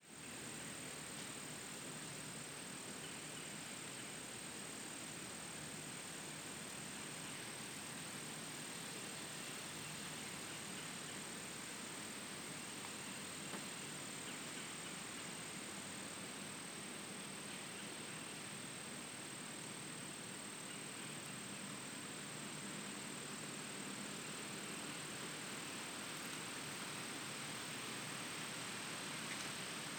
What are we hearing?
Soundscapes > Nature
Quiet Autumn woods soundscape October 2025 Oakwood
A very quiet soundscape recorded on early October of 2025 in the Oakwood Bottoms area of southern Illinois. Hope you enjoy this serene autumn scene. Equipment- SONY PCM D-100 using its built-in Cardioid microphones in X/Y configuration.
Autumn, Birds, Forest, Serene, Wind, Woods